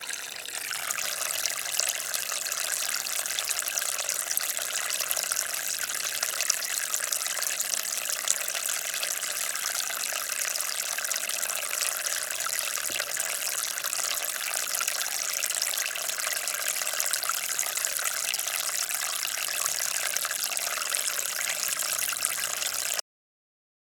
Soundscapes > Nature
Insane Pointy Marble stream
waterstream, trickle, river, drops, stream, water